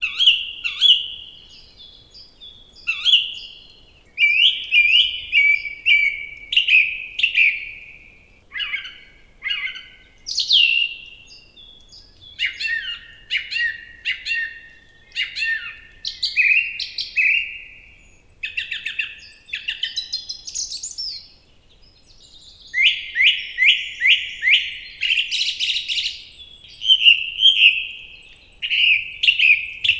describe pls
Sound effects > Animals
Beautiful birdsong 9
Beautiful bird song recording recorded in a Polish forest. Sounds of birds singing in their natural habitat. No human or mechanical sounds. Effects recorded from the field.